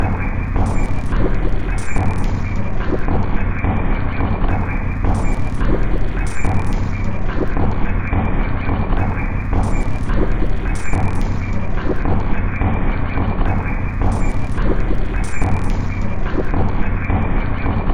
Instrument samples > Percussion
This 107bpm Drum Loop is good for composing Industrial/Electronic/Ambient songs or using as soundtrack to a sci-fi/suspense/horror indie game or short film.
Weird, Dark, Loop, Underground, Alien, Samples, Packs, Soundtrack, Loopable, Drum, Industrial, Ambient